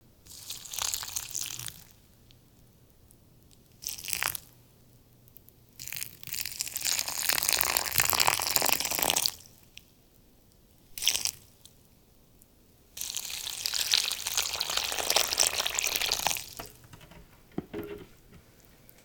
Sound effects > Objects / House appliances
Watering Can Pouring 1
Water pouring from a plastic watering can. Recorded with Rode NTG5.
foley, liquid, pour, pouring, water